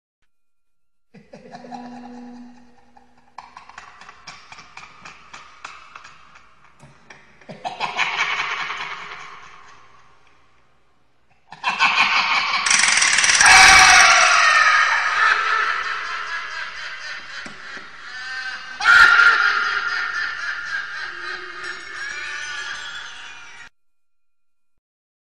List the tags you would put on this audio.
Sound effects > Other
emotion laugh creepy

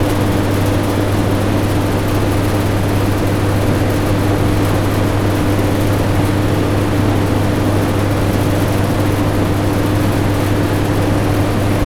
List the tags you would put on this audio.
Soundscapes > Indoors
biennale fan conditioning rotation air exhibition field-recording vent musem ac ventilator air-conditioning

